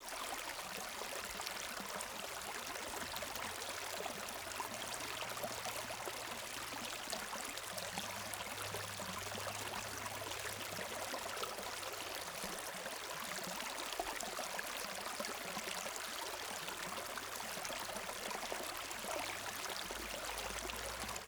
Sound effects > Natural elements and explosions
Recorded on Sony PCM A10.